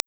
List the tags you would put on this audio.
Sound effects > Electronic / Design
clicks electronic glitch

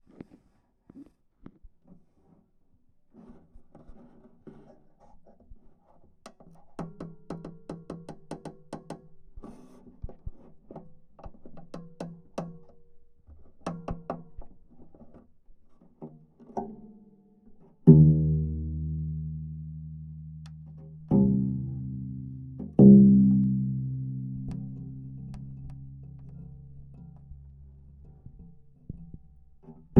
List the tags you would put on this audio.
Instrument samples > String
tune strings tunning cello

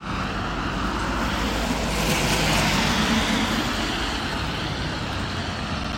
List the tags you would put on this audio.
Sound effects > Vehicles
car drive engine hervanta outdoor road tampere

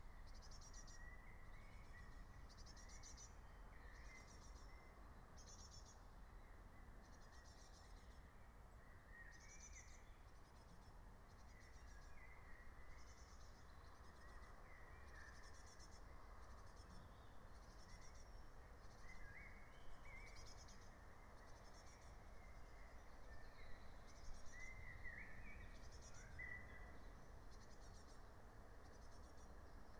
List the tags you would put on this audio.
Soundscapes > Nature
raspberry-pi alice-holt-forest

